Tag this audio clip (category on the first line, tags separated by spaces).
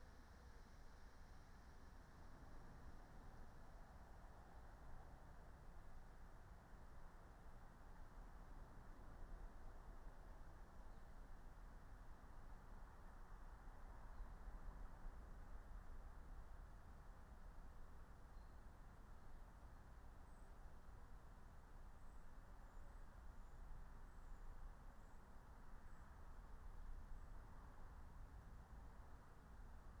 Soundscapes > Nature
nature natural-soundscape field-recording soundscape raspberry-pi meadow alice-holt-forest phenological-recording